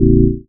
Instrument samples > Synths / Electronic
WHYBASS 2 Bb
additive-synthesis, bass